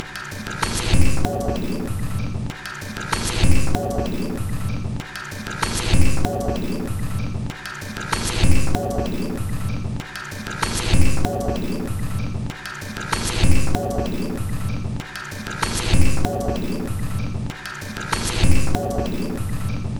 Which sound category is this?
Instrument samples > Percussion